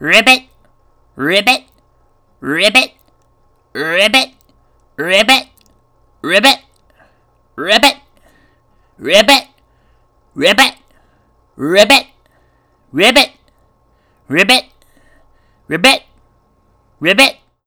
Sound effects > Animals
TOONAnml-Blue Snowball Microphone, CU Frog Ribbit, Human Imitation, Cartoon Nicholas Judy TDC
A frog 'ribbit'. Human imitation. Cartoon.